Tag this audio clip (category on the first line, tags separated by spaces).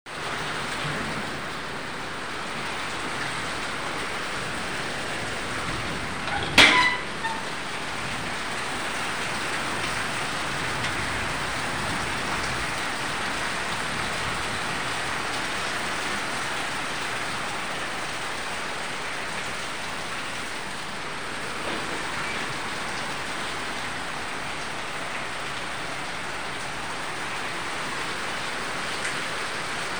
Sound effects > Natural elements and explosions
thundershower weather thunderstorm typhoon